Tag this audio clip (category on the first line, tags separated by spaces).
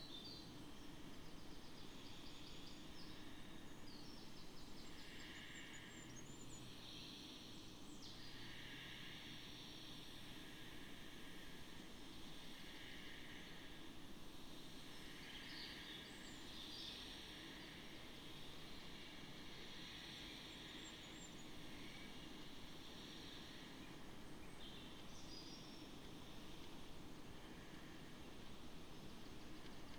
Soundscapes > Nature
Dendrophone artistic-intervention soundscape weather-data modified-soundscape phenological-recording nature alice-holt-forest data-to-sound natural-soundscape raspberry-pi field-recording sound-installation